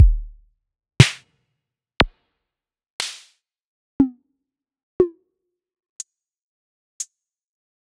Instrument samples > Percussion
Lucia Drum Kit #007
cowbell, drum, hihat, kick, kit, percussion, rimshot, snare, synth, thwack, tom, woodblock